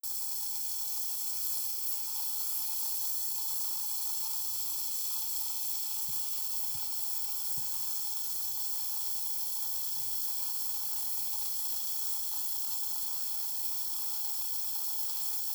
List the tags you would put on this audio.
Sound effects > Other
Disolving
Water

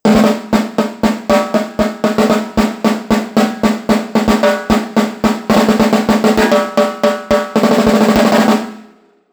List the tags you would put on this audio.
Instrument samples > Percussion
acoustic
drum
drum-loop
drums
garbage
groovy
hh
hihats
hit
improvised
loop
percs
percussion
percussion-loop
percussive
samples
snare
solo
sticks

